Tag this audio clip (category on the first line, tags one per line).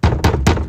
Sound effects > Other
Door
Knock
Knocking